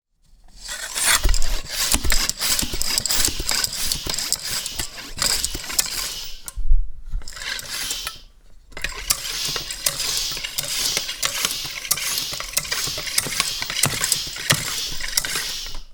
Sound effects > Objects / House appliances
A bicycle air pump inflating a tire. Recorded with a Zoom H1essential.